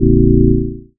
Instrument samples > Synths / Electronic
WHYBASS 1 Bb
bass, fm-synthesis